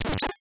Sound effects > Electronic / Design
MOVING LOWBIT SERVER BLEEP
BEEP, BOOP, CHIPPY, CIRCUIT, COMPUTER, DING, ELECTRONIC, EXPERIMENTAL, HARSH, HIT, INNOVATIVE, OBSCURE, SHARP, SYNTHETIC, UNIQUE